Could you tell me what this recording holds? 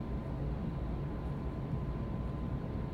Sound effects > Other mechanisms, engines, machines
Ventilation Ambience
Recorded the sound of my home's ventilation system using my computer. It's not a perfectly seamless loop though so keep that in mind.
ac, air-conditioner, ambience, bathroom, facility, factory, fan, industrial, ventilation, ventilator